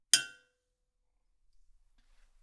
Sound effects > Other mechanisms, engines, machines
Woodshop Foley-076
tink, crackle, oneshot, bam, foley, bop, bang, perc, tools, sound, fx, knock, shop, strike, pop, metal, percussion, rustle, little, thud, sfx, wood, boom